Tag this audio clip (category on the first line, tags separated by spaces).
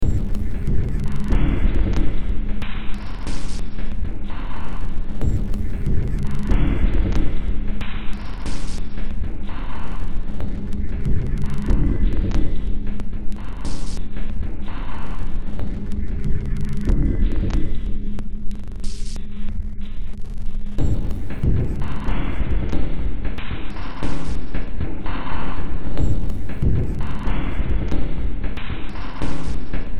Music > Multiple instruments
Ambient Noise